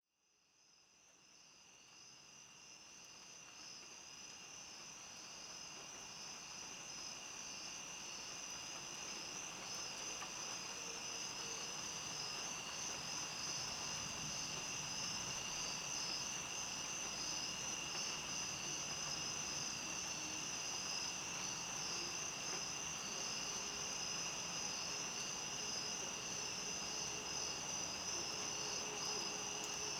Soundscapes > Nature
Thundershower Compilation 94-5 25
A 93 minute compilation of thundershower audio recorded from my condo's deck. The first half hour is from last night (9/4/25). It was an early evening thundershower that had some wind driven rain. The rest of the audio was taken later that night (9/4-9/5/25) from a slow moving rain event that had mild imbedded thunderstorms. The audio mainly consists of rain, wind, cricket chirps, and occasional quiet thunder rumbles. A good deal of the recording has peaks and valleys as the rain kept alternating between showers and drizzle. Recorded with a Zoom H6 Essential recorder. Edited and mixed with AVS Audio Editor.
field-recording, rain, thunder, crickets, thunderstorm, nature, wind